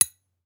Sound effects > Other mechanisms, engines, machines
Metal Hit 03
hit, metal, noise, sample